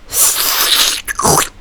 Sound effects > Human sounds and actions
It's just me recording my own licking sound. Thanks!
Animation, Cartoon, Drooling, Gross, Human, Lick, Licking, Licks, Mouth, Slobbering, Sloppy, Slurp, Slurping, Slurps, Suck, Sucking, Sucks, Tongue, Vocals